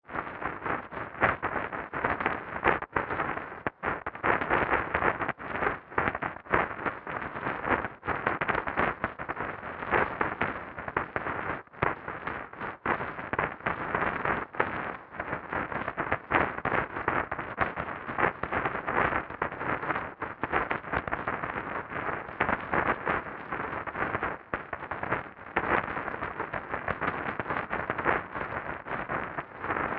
Sound effects > Objects / House appliances
I synth it with phasephant! Used the Footsteep sound from bandLab PROTOVOLT--FOLEY PACK. And I put it in to Granular. I used Phase Distortion to make it Crunchy. Then I give ZL Equalizer to make it sounds better.